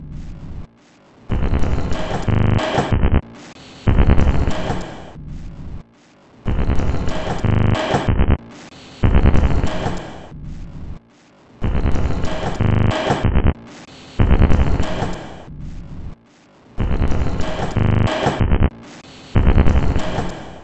Instrument samples > Percussion
This 93bpm Drum Loop is good for composing Industrial/Electronic/Ambient songs or using as soundtrack to a sci-fi/suspense/horror indie game or short film.
Packs, Dark, Drum, Soundtrack, Samples, Alien, Industrial, Loop, Ambient, Underground, Weird, Loopable